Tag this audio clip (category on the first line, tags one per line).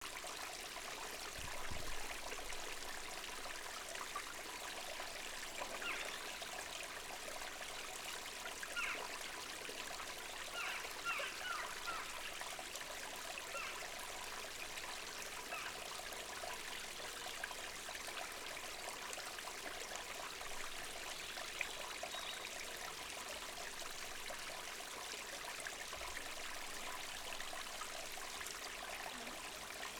Soundscapes > Nature
birds
field-recording
forest
nature
waterfall
woodland
woods